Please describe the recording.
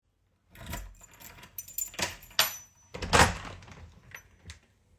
Sound effects > Other mechanisms, engines, machines
Unlocking Door
The sound of a key unlocking a door. Recorded using a Google Pixel 9a phone. I want to share them with you here.
door, key, keys, Unlocked, unlocking